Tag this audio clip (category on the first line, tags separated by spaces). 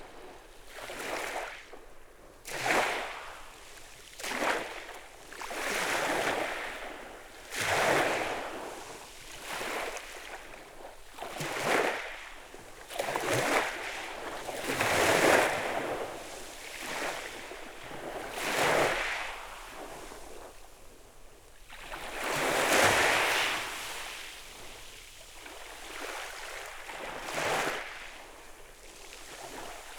Soundscapes > Nature
beach seaside waves